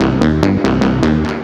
Instrument samples > Synths / Electronic
CVLT BASS 38
sub, wavetable, stabs, drops, wobble, bassdrop, lfo, clear, low, synthbass, synth, subs, bass, lowend, subwoofer, subbass